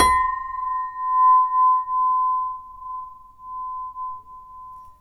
Sound effects > Other mechanisms, engines, machines
metal shop foley -062
bam, bop, crackle, foley, fx, knock, little, oneshot, perc, pop, rustle, sfx, shop, sound, strike, thud, wood